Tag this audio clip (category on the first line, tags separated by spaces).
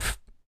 Speech > Solo speech
Voice-acting annoyed Neumann Video-game Mid-20s U67 Male Vocal upset FR-AV2 oneshot grumpy singletake talk Human Single-take dialogue Man